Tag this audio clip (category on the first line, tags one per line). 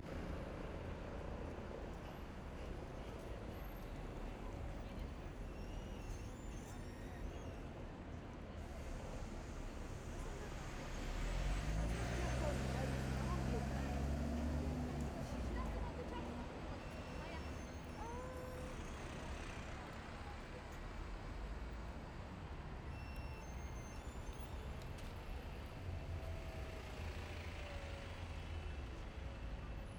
Soundscapes > Urban
Busy
Ambience
Day
Transport
Multichannel
2OA
O2A
City
Spatial
Walking
Free
Cars
Binaural
Street
Immersive
City-Ambience